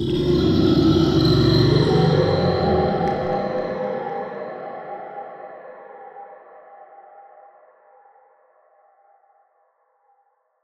Sound effects > Experimental
Creature Monster Alien Vocal FX-35
devil, boss, Fantasy, evil, Snarl, Alien, Growl, Otherworldly, sfx, Monstrous, gamedesign, Groan, Sounddesign, Frightening, Reverberating, fx, Ominous, Sound, Vocal, Animal, scary, Echo, Snarling, Deep, visceral, gutteral, demon, Creature, Monster, Vox